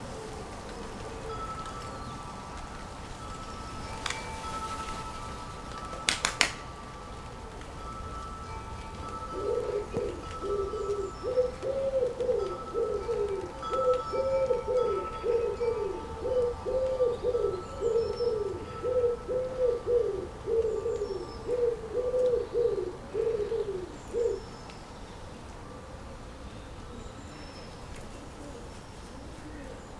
Soundscapes > Nature
Morning Sounds January
The sounds I often wake up to in January. This is a continuous and unedited clip from an unattended setup used to monitor the birds flying over or near my garden. Sounds that can be heard: Wing clap Woodpigeon Feral pigeon (rock dove/rock pigeon) Wind chimes Rain Clippy mic attached to a Zoom H1essential and left overnight.
wood-pigeon, wind-chimes, feral-pigeon, rain, wind, soundscape, woodpigeon, january, pigeon, Zoom-H1e